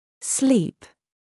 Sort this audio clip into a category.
Speech > Solo speech